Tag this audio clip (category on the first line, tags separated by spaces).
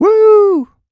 Speech > Solo speech
2025 Adult Calm FR-AV2 Generic-lines Happy Hypercardioid july Male mid-20s MKE-600 MKE600 Sennheiser Shotgun-mic Shotgun-microphone Single-mic-mono Tascam VA Voice-acting whooo